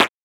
Instrument samples > Synths / Electronic
A growly, short one-shot made in Surge XT, using FM synthesis.
synthetic, electronic